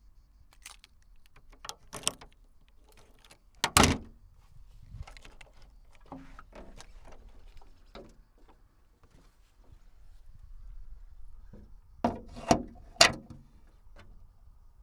Sound effects > Vehicles
Ford 115 T350 - Hood opening (and stucked open)
115, 2003, 2025, A2WS, August, Ford, Ford-Transit, FR-AV2, Mono, Old, Single-mic-mono, T350, Tascam, Van, Vehicle